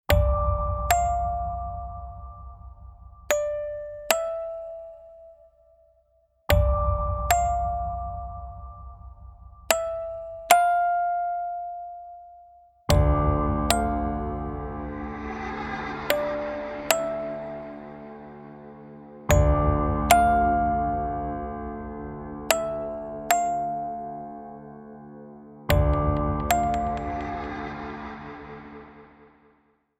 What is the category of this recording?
Music > Other